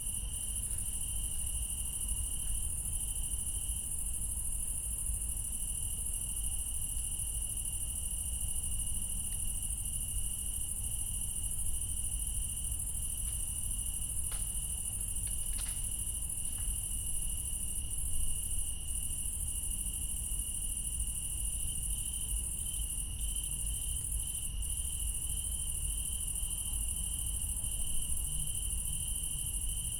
Soundscapes > Nature
AMBFarm Night Ambience Crickets Garden
Summer Night at a Garden near Leipzig recorded with 2 x Clippy EM272 + Zoom F3
Atmosphere, Crickets, Ambience, Night, Summer, Garden, Calm